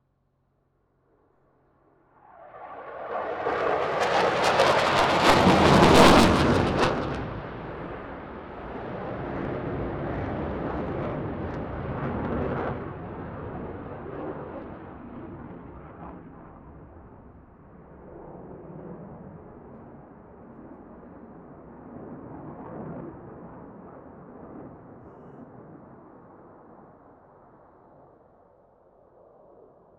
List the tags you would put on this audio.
Sound effects > Vehicles
aeroplane aircraft airplane fighter fighter-jet flight fly flying jet military pilot plane vehicle

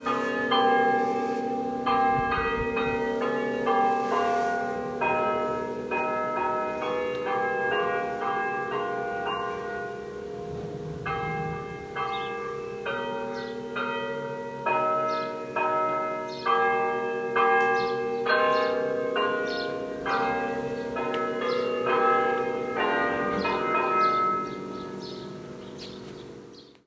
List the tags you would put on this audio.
Soundscapes > Urban
splott fieldrecording wales

